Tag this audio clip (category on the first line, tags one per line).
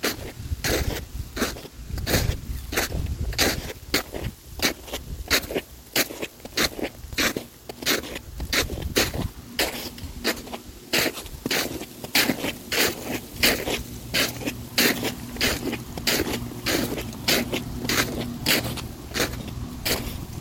Sound effects > Human sounds and actions
Phone-recording
crunchy
crunch
foley
footsteps